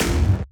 Sound effects > Experimental
destroyed glitchy impact fx -017
a collection of glitch percussion sfx made using a myriad of software vsts and programs such as reaper, fl studio, zynaptiq, minimal audio, cableguys, denise biteharder, and more
pop, laser, hiphop, crack, zap, edm, experimental, idm, glitchy, percussion, perc, otherworldy, sfx, abstract, lazer, glitch, alien, clap, impact, whizz, snap, fx, impacts